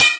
Instrument samples > Percussion
ride cymbal 2
an amateur ride bell
bassbell, bell, bellcup, bellride, click-crash, crashcup, cup, cupride, cymbal, cymbell, Istanbul, Istanbul-Agop, Meinl, metal-cup, Paiste, ping, ride, ridebell, Sabian, Zildjian